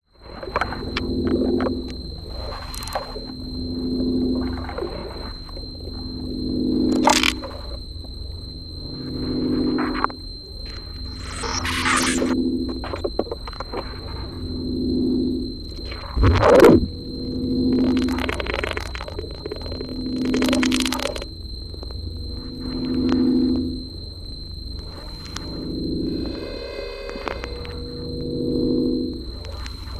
Sound effects > Electronic / Design
Konkret Jungle 11
From a pack of samples focusing on ‘concrete’ and acousmatic technique (tape manipulation, synthetic processing of natural sounds, extension of “traditional” instruments’ timbral range via electronics). This excerpt takes simple environmental sounds and adds modulation via multimode stereo filter, with an additional drone voice provided by SOMA Lyra8.
tape-manipulation, SOMA, acousmatic, musique, extended-technique, objet-sonore, Lyra8